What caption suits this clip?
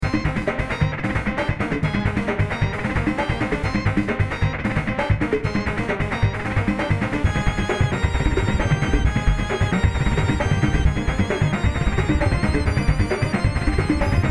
Music > Multiple instruments
A short loop that I made using beepbox a long time ago. Use this as an intro, for a video game, or for an upbeat action scene.